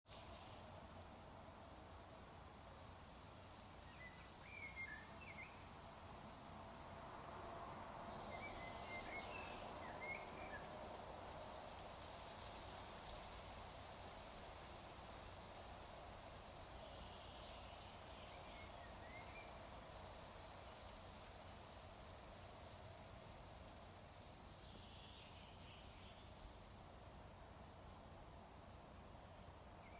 Soundscapes > Nature
forest birds Axelfors
nature soundscape original recording, birds chirping in the afternoon under setting sun in Summer Solstice in the forest close to motorway, Axelfors, Scandinavia
afternoon, ambience, ambient, Axelfors, birds, field-recording, forest, nature, scandinavia, soundscape, village, wilderness